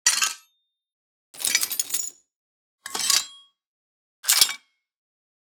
Sound effects > Electronic / Design
UIMvmt Equip Item, Weapon or Armor, Metallic Selection x4
Metallic equip UI sfx for items, swords, armor etc. Recorded a pile of scrap nuts and bolts in the california desert with DR-07x and edited in Ableton.
UI, midieval, metallic, elden, warcraft, selection, game, oblivion, ring, weapon, equip